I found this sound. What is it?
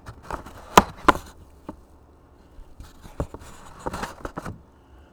Sound effects > Objects / House appliances
OBJCont-Blue Snowball Microphone, CU Egg Carton, Open, Close Nicholas Judy TDC
An egg carton opening and closing.
Blue-Snowball, egg-carton, foley